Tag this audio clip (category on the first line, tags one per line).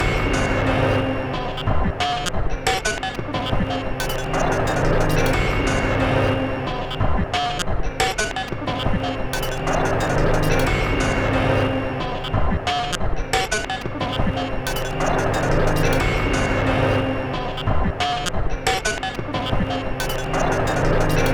Instrument samples > Percussion
Alien
Drum
Industrial
Loop
Loopable
Packs
Samples
Soundtrack
Underground
Weird